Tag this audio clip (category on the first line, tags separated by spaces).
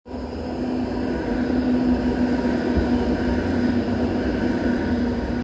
Sound effects > Vehicles
city public-transport tram